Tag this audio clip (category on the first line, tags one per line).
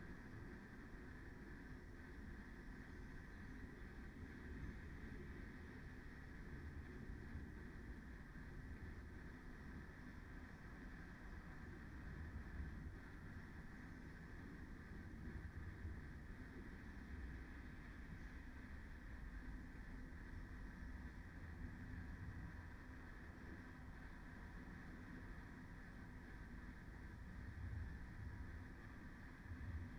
Soundscapes > Nature
natural-soundscape
weather-data